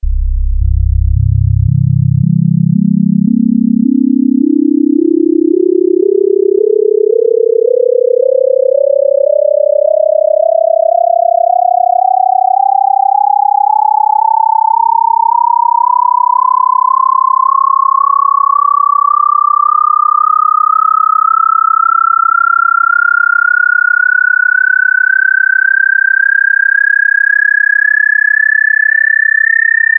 Instrument samples > Synths / Electronic
06. FM-X RES1 SKIRT1 RES0-99 bpm110change C0root
Yamaha, FM-X, MODX, Montage